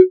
Instrument samples > Synths / Electronic
CAN 8 Gb
additive-synthesis, bass, fm-synthesis